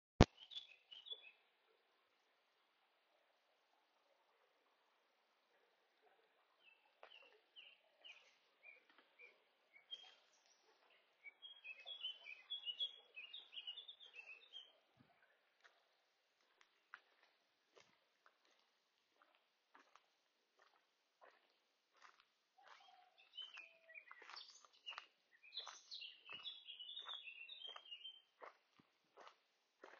Soundscapes > Nature
Birds chattering to each other at the beck in Keighley, West Yorkshire
Morning, Field-recording, Bird, Birdsong, Nature, Birds, Peaceful